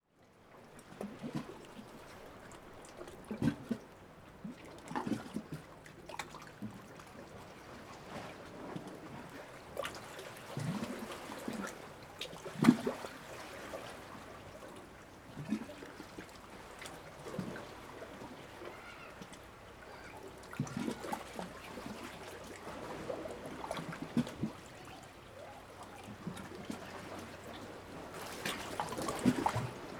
Soundscapes > Nature
Cave by the sea atmosphere
Atmospheric sounds from a cave by the Mediterranean Sea. Some gulping sounds can be heard as the water level rises and falls when it hits the rocks. Some faint bird sounds in the background.
day, atmosphere, exterior, sea, cave, sounds, gulping, atmos, ambience